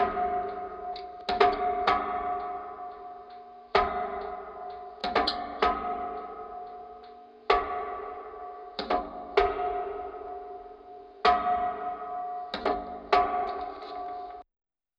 Music > Solo percussion
Reverb guitar percussion
acoustic, loop, techno